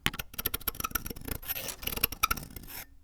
Sound effects > Other mechanisms, engines, machines
metal shop foley -113
bam, bang, boom, bop, crackle, foley, fx, knock, little, metal, oneshot, perc, percussion, pop, rustle, sfx, shop, sound, strike, thud, tink, tools, wood